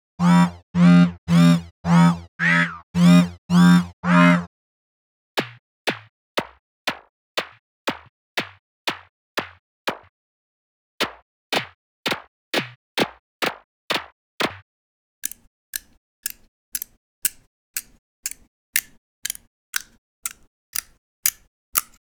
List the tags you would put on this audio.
Sound effects > Electronic / Design
Bones
Clatter
Foley
Mechanical
Metallic
Retro
Robot
Robotic
SFX
Skeleton
Steampunk
Undead

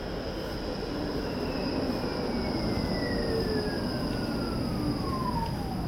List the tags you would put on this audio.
Sound effects > Vehicles
Finland
Public-transport
Tram

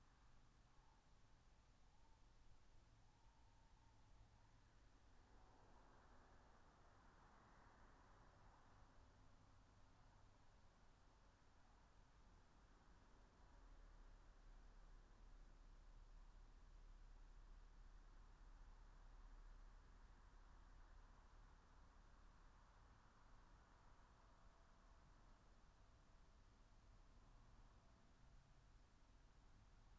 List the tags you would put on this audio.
Soundscapes > Nature
nature
alice-holt-forest
meadow
field-recording
raspberry-pi
phenological-recording
natural-soundscape
soundscape

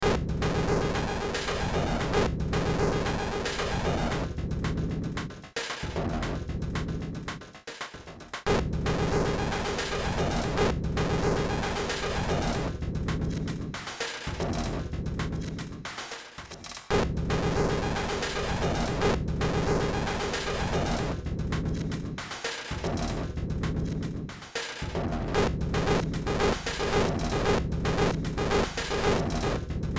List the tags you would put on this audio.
Music > Multiple instruments
Industrial
Horror
Sci-fi
Underground
Games
Cyberpunk
Soundtrack
Noise
Ambient

